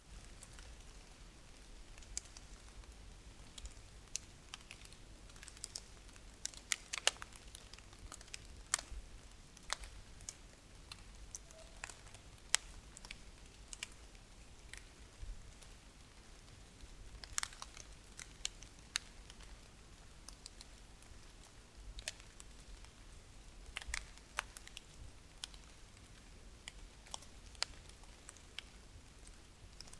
Sound effects > Natural elements and explosions
dripping rain hitting leaves 2
Dripping rain in forest hitting leaves. Sounds like a fire. Location: Poland Time: November 2025 Recorder: Zoom H6 - SGH-6 Shotgun Mic Capsule
ambience, autumn, dripping, drumming, fire, forest, leaves, rain, rustle